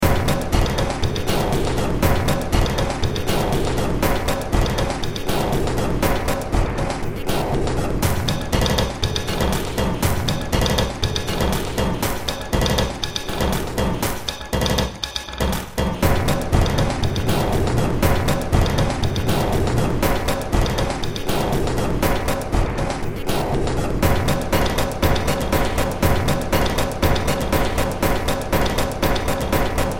Music > Multiple instruments
Demo Track #3537 (Industraumatic)
Horror, Soundtrack, Underground, Ambient, Games, Industrial, Sci-fi, Noise